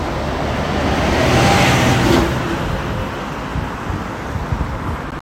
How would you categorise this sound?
Soundscapes > Urban